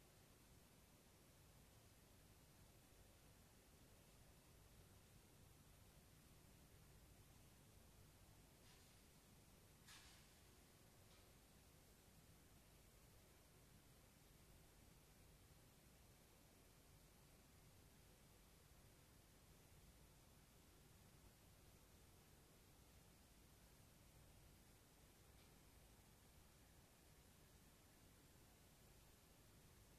Soundscapes > Indoors
ANVSN0005 ROOMTONE#1
Noche. Interior: Cocina de un departamento en la ciudad. Tranquilo. Grabado con un Moto g32 Producido en Tucumán, Argentina, en 2025 Night. Interior: City appartment kitchen. Quiet. Recorded on Moto g32 Produced in Tucumán, Argentina, in 2025
kitchen, roomtones, night, roomtone, department, quiet, interior